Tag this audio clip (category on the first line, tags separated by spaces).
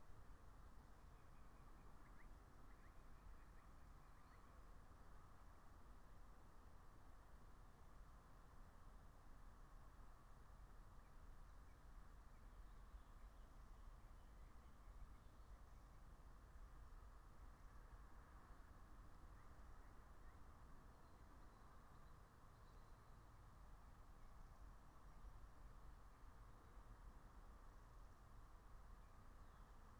Soundscapes > Nature
alice-holt-forest phenological-recording nature field-recording raspberry-pi meadow natural-soundscape soundscape